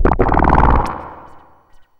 Instrument samples > Synths / Electronic
Benjolon 1 shot7
NOISE, BENJOLIN, SYNTH, CHIRP, DRUM, MODULAR